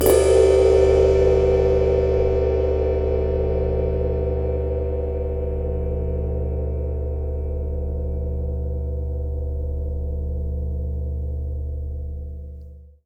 Music > Solo instrument
Paiste 22 Inch Custom Ride-012
22inch, Custom, Cymbal, Cymbals, Drum, Drums, Kit, Metal, Oneshot, Paiste, Perc, Percussion, Ride